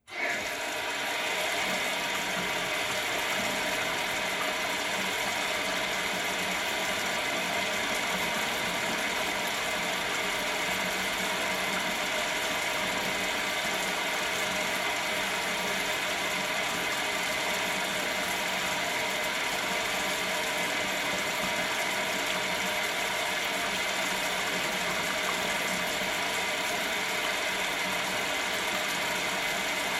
Sound effects > Objects / House appliances
A sink faucet turning on, running and turning off. Airy hiss left and water run right.